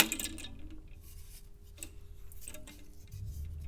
Sound effects > Other mechanisms, engines, machines
Woodshop Foley-010
bam, bang, boom, bop, crackle, foley, fx, knock, little, metal, oneshot, perc, percussion, pop, rustle, sfx, shop, sound, strike, thud, tink, tools, wood